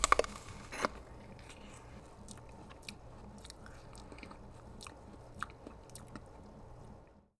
Sound effects > Human sounds and actions
FOODEat-Blue Snowball Microphone Apple, Bite, Chewing Nicholas Judy TDC
Biting into an apple and chewing.
Blue-Snowball, human, foley